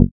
Instrument samples > Synths / Electronic
MEOWBASS 8 Bb
additive-synthesis, bass, fm-synthesis